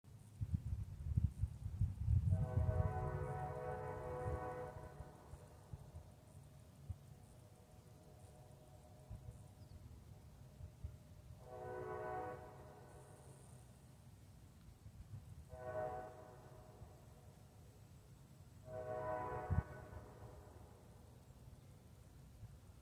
Soundscapes > Urban
Distant Train
A train bellowing in the distance. Some natural sounds can be heard as well. Recorded in southern Ohio.